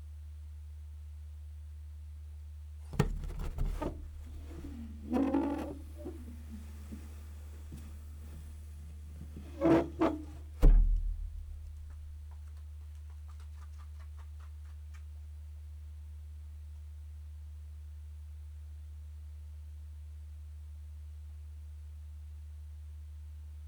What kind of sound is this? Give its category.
Sound effects > Animals